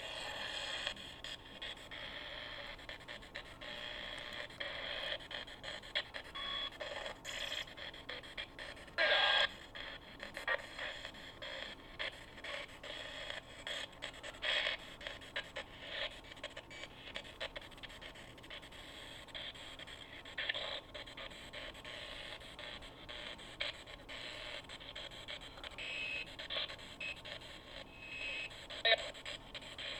Sound effects > Objects / House appliances
COMRadio-Blue Snowball Microphone, MCU Hand Turbine, Tuning, AM Band Nicholas Judy TDC
A hand turbine radio tuning. AM band. Much static.
am, Blue-Snowball, tuning